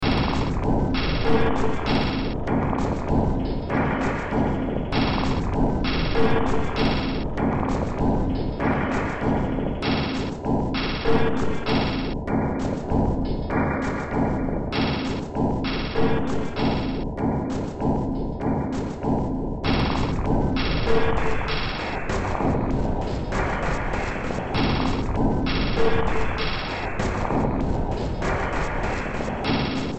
Music > Multiple instruments
Demo Track #3940 (Industraumatic)
Games, Ambient, Noise, Cyberpunk, Industrial, Soundtrack, Sci-fi, Horror, Underground